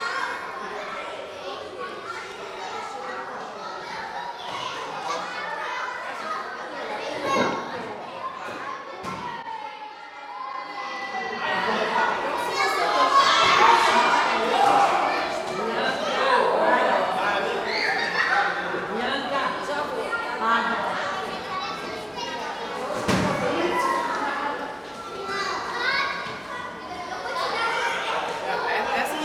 Soundscapes > Indoors
4- Crianças brincando na escola - Kids playing on school (brazilian portuguese)
Áudio gravado no Colégio Objetivo de Botafogo, na cidade do Rio de Janeiro (Rua Álvaro Ramos, n° 441) no dia 6 de outubro de 2022. Gravação originalmente feita para o documentário "Amaro: O Colégio da Memória", sobre o vizinho Colégio Santo Amaro, que fechou durante a pandemia. Áudio curto, testando volume de captação, de crianças brincando e conversando, relativamente perto do microfone. Vozes de adultos (inspetores) aparecem ocasionalmente. Foi utilizado o gravador Zoom H1N. // Audio recorded at the Objetivo School in the Botafogo neighborhood, in Rio de Janeiro, on the october 6th, 2022. Recording originally made for the brazilian documentary feature film "Amaro: The School in Our Memory", which tells the story of the Santo Amaro School, also located in Botafogo, but closed during the pandemic. Short audio, testing the recording volume. Children talking and playing on school yard, relatively close to the microphone. Made with Zoom H1N's internal mics.